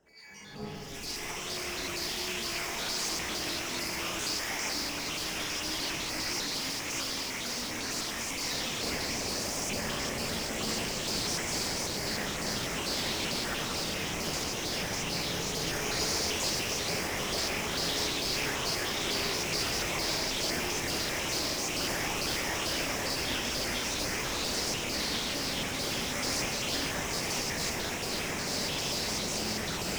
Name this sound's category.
Sound effects > Electronic / Design